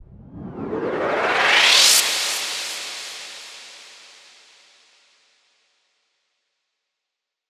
Sound effects > Electronic / Design
RISER SWOOSH
A functional white noise transition effect. The sound sweeps upward in frequency and volume, creating a classic 'whoosh' or 'riser' effect used to build tension or transition between sections in electronic music.
synthetic, electronic, fx, riser, sound-effect, transition, sound-design